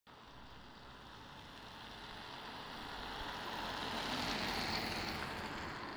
Sound effects > Vehicles
tampere car19
car passing by near Tampere city center
car, automobile